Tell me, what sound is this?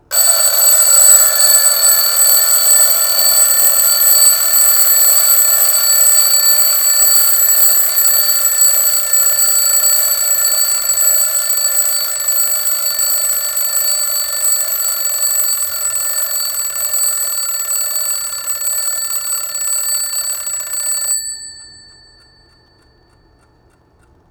Sound effects > Objects / House appliances
ALRMClok-Blue Snowball Microphone, CU Windup, Ringing Nicholas Judy TDC
A wind-up alarm clock ringing.
alarm, Blue-brand, clock